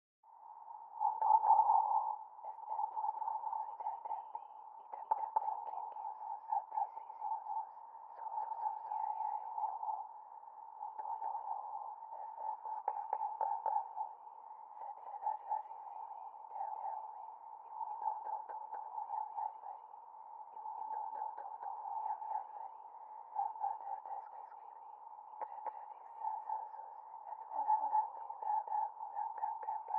Speech > Processed / Synthetic
A heavily edited voice whispering in Latin
dream, eerie, haunt, whispering, creepy, latin, ghost, whisper, haunted